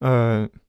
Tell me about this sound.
Sound effects > Human sounds and actions
Noises - Ehh
Neumann, Video-game, talk, Human, Mid-20s